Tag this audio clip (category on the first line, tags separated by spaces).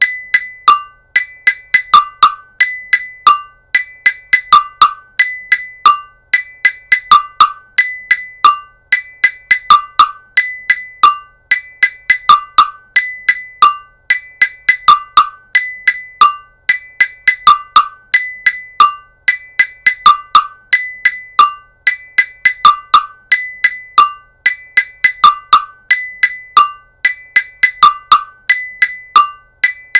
Music > Solo percussion
agogo agogo-bell bell Blue-brand Blue-Snowball rhythm